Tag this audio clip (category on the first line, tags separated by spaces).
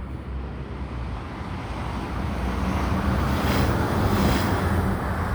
Sound effects > Vehicles
bus engine vehicle